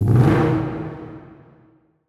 Sound effects > Vehicles
Throttle Blip Mix
Base sound is a Muscle Car revving engine. I took the first "blip" of the Muscle Car Throttle Blips (see Car Revving Field Recording sound pack) and added a large ambiance reverb. This is a good base sound to play around with.